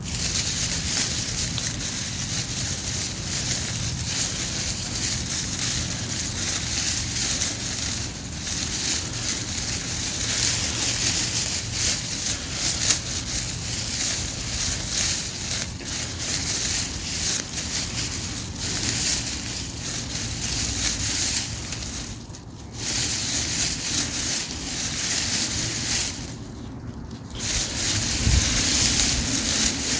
Sound effects > Objects / House appliances
VEGEMisc-Samsung Galaxy Smartphone, CU Bush, Rustle Nicholas Judy TDC
A bush rustling.
bush, foley, Phone-recording, rustle